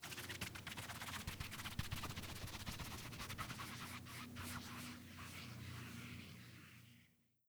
Sound effects > Other
Swish, Spinning, Fast to Slow, on Fabric, some Skipping
Foley for an object spinning to a stop on a tablecloth; could also be used as emotion sfx for any spinning thing starting fast and slowing to a stop.
slowing, material, twirl, spin, fabric, air